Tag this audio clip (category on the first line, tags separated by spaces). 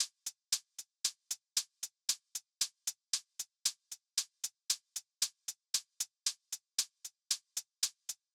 Instrument samples > Percussion
115bpm clock drum drums loop sample symbols tick